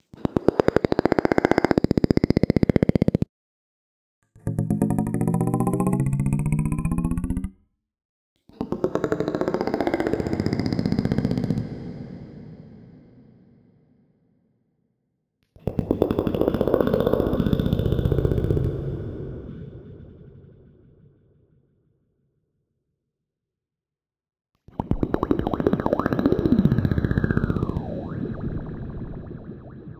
Sound effects > Experimental
Trippy Vocal Clicks and Phasing FX
a sequence of strange and trippy vocal click FX created with my voice recorded into a Sure Beta 58A into an AudioFuse interface, processed through Reaper using Minimal Audio Raum and Native Instruments FX Ripple Phaser
abstract, alien, animal, atmosphere, click, clicks, creature, demonic, effect, fantasy, fx, ghost, growl, monster, noise, other-worldly, sci-fi, scifi, sfx, sound-design, sounddesign, soundeffect, strange, trippy, vocal, vox, weird, wtf